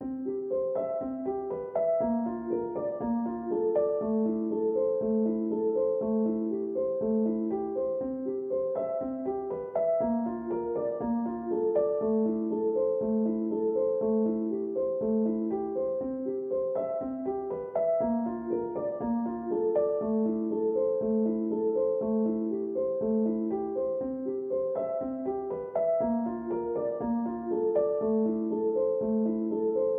Music > Solo instrument
Piano loops 196 octave down short loop 120 bpm
120, 120bpm, free, loop, music, piano, pianomusic, reverb, samples, simple, simplesamples